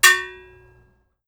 Music > Solo percussion
A cowbell hit.